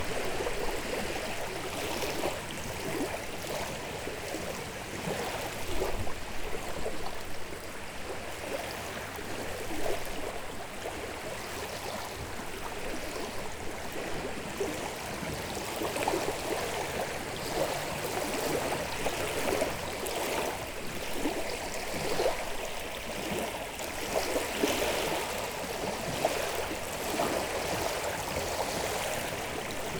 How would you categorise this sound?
Soundscapes > Nature